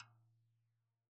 Music > Solo percussion
Med-low Tom - Oneshot 30 12 inch Sonor Force 3007 Maple Rack
perc, med-tom, real, roll